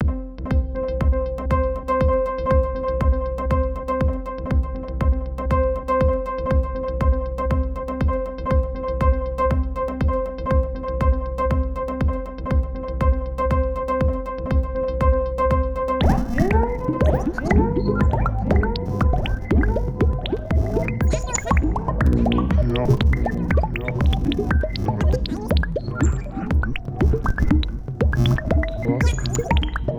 Soundscapes > Synthetic / Artificial
Soundscape travel through the galaxy
I imagined to be on a light particle, travelling at the speed on lighe between galaxies and planets #0:00 jumping on the planet earth while looking at the sky #0:16 at the border: fast forward to the galaxy hub #0:32 on planet Amazonia, where birds play drums #0:48 on asteroid B45, speaking with a old village woman #1:04 lost in the space, travelling #1:20 landed on planet Corona, negotiating the entrance tax #1:36 still on planet Corona, dropping rain on the head of the people #2:00 while repairing the shuttle, jiggling with the screwdrivers #2:16 landing on planet Foster, in the main square #2:40 falling asleep Produced with my beloved Digitakt 2
voices, galaxy, ambient, soundscape